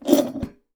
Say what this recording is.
Music > Solo instrument
Vintage Custom 14 inch Hi Hat-003
Custom, Cymbal, Cymbals, Drum, Drums, Hat, Hats, HiHat, Kit, Metal, Oneshot, Perc, Percussion, Vintage